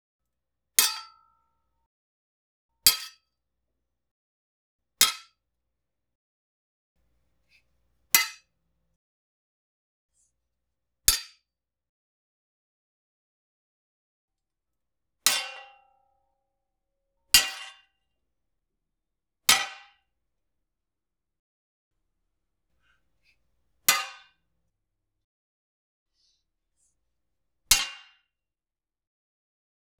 Sound effects > Objects / House appliances
heavy and light sword hits with ringouts.